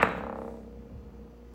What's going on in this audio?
Sound effects > Objects / House appliances
GAMEBoard wooden pirinola hollow vibration buzz echo fall constant fast
The wooden top spins rapidly on a hollow wooden table, generating a rapid, steady whirring sound. The vibration of the top as it lands resonates with the surface
constant, fast, vibration, wooden, hollow, fall, pirinola, buzz, echo